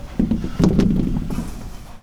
Sound effects > Objects / House appliances
Junkyard Foley and FX Percs (Metal, Clanks, Scrapes, Bangs, Scrap, and Machines) 38
Smash, Clank, dumping, Environment, dumpster, FX, Ambience, waste, Junkyard, trash, SFX, Atmosphere, Machine, Percussion, Dump, tube, Metallic, Clang, Bang, rubbish, garbage, Junk, Foley, Metal, Perc, rattle, Robot, Bash, scrape, Robotic